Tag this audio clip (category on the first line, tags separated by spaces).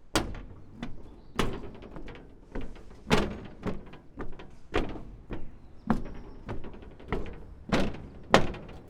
Sound effects > Human sounds and actions
Early-morning Occitanie Albi Tarn NT5 FR-AV2 France Single-mic-mono walking metal Saturday Wind-cover walk handheld 2025 Outdoor Early 81000 footsteps urbain hand-held Rode WS8 Tascam Mono morning City